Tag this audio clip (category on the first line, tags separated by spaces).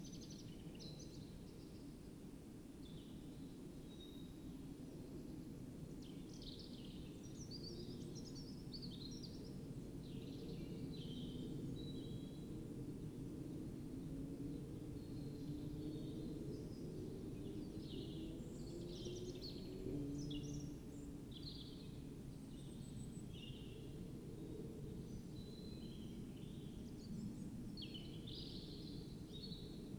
Soundscapes > Nature
artistic-intervention Dendrophone nature soundscape